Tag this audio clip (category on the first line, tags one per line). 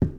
Sound effects > Objects / House appliances
bucket
carry
clang
clatter
cleaning
container
debris
drop
fill
foley
metal
object
pail
plastic
pour
scoop
shake
slam
tip
tool